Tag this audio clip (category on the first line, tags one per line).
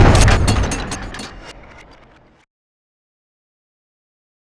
Sound effects > Other
audio
blunt
cinematic
collision
crash
design
effects
explosion
force
game
hard
heavy
hit
impact
percussive
power
rumble
sfx
sharp
shockwave
smash
sound
strike
thudbang
transient